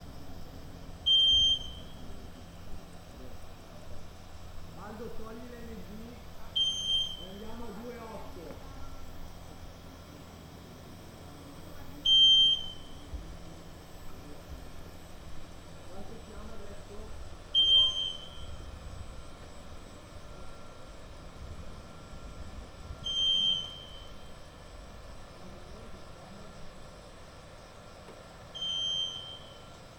Soundscapes > Urban
antennas day ambience and movement
Northern Cross Radio Telescope ambience recorded with zoom h6 at Medicina Radio Observatory
day, antennas, telescope, ambience